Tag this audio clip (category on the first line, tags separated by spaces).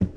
Sound effects > Objects / House appliances
spill garden clatter fill plastic household liquid knock lid pail drop bucket clang carry hollow slam debris scoop cleaning tool shake container water pour object tip foley handle metal kitchen